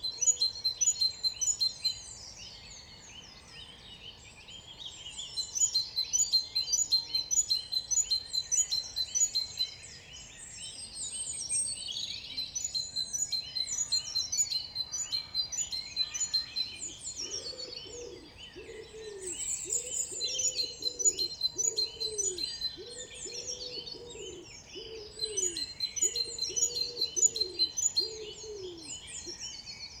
Soundscapes > Nature
Dawn chorus along railway line, great tit (Delamere Forest)
📍 Delamere Forest, England 08.04.2025 6am Recorded using a pair of DPA 4060s on Zoom F6 Birds identified by Merlin: Great Tit, Eurasian Wren, Common Wood Pigeon, Eurasian Blackbird
birdsong, dawn-chorus, nature, spring, forest, birds, field-recording